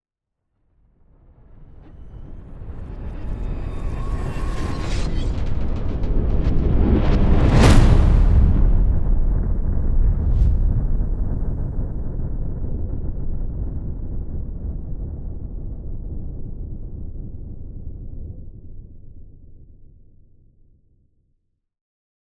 Sound effects > Other
Sound Design Elements SFX PS 066
metal, movement, video, impact, tension, hit, transition, trailer, sweep, whoosh, implosion, game, riser, effect, stinger, explosion, epic, deep, indent, reveal, bass, boom, industrial, cinematic, sub